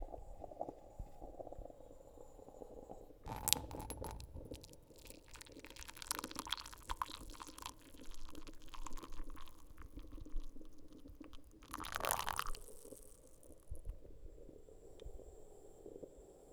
Soundscapes > Indoors
Pouring Coffee from Italian Moka into a Cup
The sound of pouring coffee from a Bialetti Moka into a cup
bar, breakfast, field-recording, Pouring, Bialetti, italy, coffee, moka, machine, espresso, cup